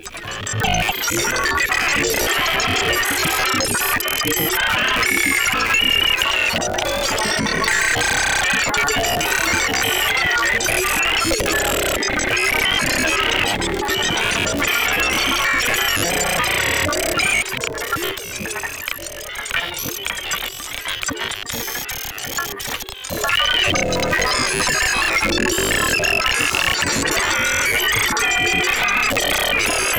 Sound effects > Electronic / Design
Glitch Sound 1
Digital, Effect, FX, Glitch